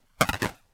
Sound effects > Other
spell lightning a
17 - Weak Lightning Spells Foleyed with a H6 Zoom Recorder, edited in ProTools
lightning, weak